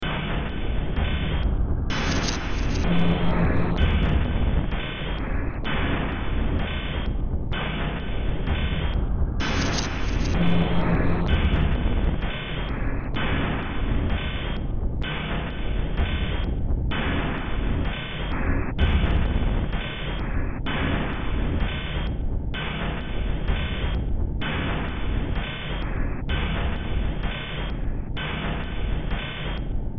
Music > Multiple instruments
Demo Track #3370 (Industraumatic)
Sci-fi, Ambient, Noise, Soundtrack, Horror, Underground, Industrial, Games, Cyberpunk